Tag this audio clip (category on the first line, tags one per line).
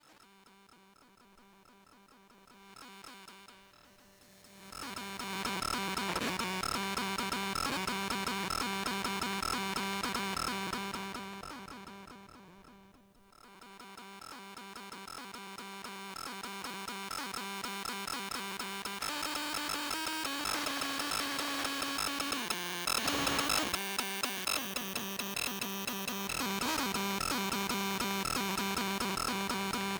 Sound effects > Objects / House appliances
field-recording
circuits
coil
ipad
pick-up
electric
magnetic
electromagnetic
field
noise
pickup
tablet
electrical